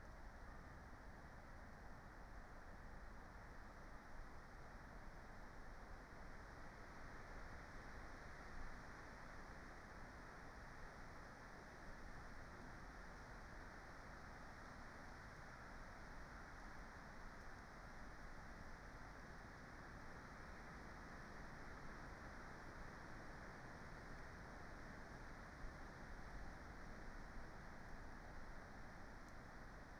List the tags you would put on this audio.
Soundscapes > Nature
artistic-intervention
data-to-sound
soundscape
field-recording
sound-installation
natural-soundscape
nature
Dendrophone
alice-holt-forest
weather-data
modified-soundscape
phenological-recording
raspberry-pi

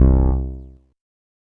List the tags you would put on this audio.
Instrument samples > Synths / Electronic
bass
synth
vst
vsti

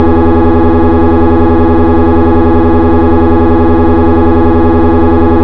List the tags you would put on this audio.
Sound effects > Other mechanisms, engines, machines
567
prime
engine
mover
2-stroke
train
rail
emd
motor
railroad
railway
diesel
v16

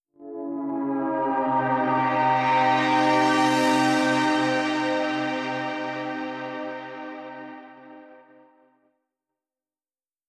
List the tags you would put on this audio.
Sound effects > Electronic / Design
flstudio24
GuitarRig7
Vanguard
audacity

